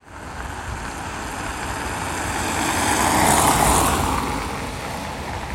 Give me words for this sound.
Sound effects > Vehicles
car sunny 10
car, engine, vehicle